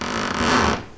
Sound effects > Objects / House appliances
Floorboards creaking, hopefully for use in games, videos, pack of 18.